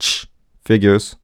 Speech > Solo speech
Displeasure - Thss figures
dialogue, displeasure, FR-AV2, Human, Male, Man, Mid-20s, Neumann, NPC, oneshot, Sentence, singletake, Single-take, talk, Tascam, U67, Video-game, Vocal, voice, Voice-acting